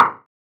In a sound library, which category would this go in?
Instrument samples > Percussion